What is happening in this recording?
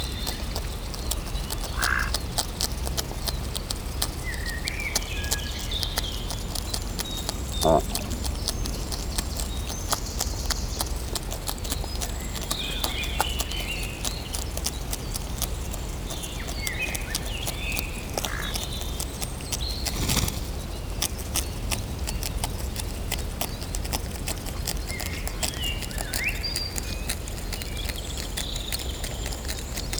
Soundscapes > Nature
Geese Munching Grass (Bedgebury Forest)
📍 Bedgebury Pinetum & Forest, England 15.05.2025 12.51pm Recorded using a pair of DPA 4060s on Zoom F6